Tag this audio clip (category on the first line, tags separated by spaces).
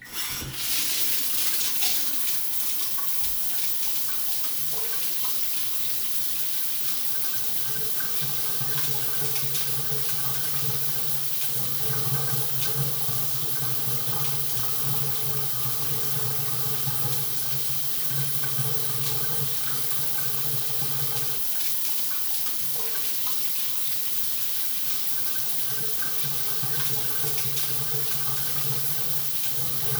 Sound effects > Objects / House appliances
turn-on turn-off drop drops Phone-recording big rain drip drips run shower